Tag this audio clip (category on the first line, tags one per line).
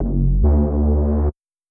Instrument samples > Synths / Electronic
LPF
flstudio24
140bpm
SATURATION
audacity
MASSIVE